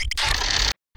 Sound effects > Experimental
Glitch Percs 25 bird stop
abstract, alien, clap, crack, edm, experimental, fx, glitch, glitchy, hiphop, idm, impact, impacts, laser, lazer, otherworldy, perc, percussion, pop, sfx, snap, whizz, zap